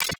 Instrument samples > Percussion
Glitch-Perc-Glitch Cymbal 8
Just retouched some cymbal sample from FLstudio original sample pack. Ramdomly made with Therapy, OTT, Fruity Limiter, ZL EQ.
Cymbal, Digital, Effect, FX, Glitch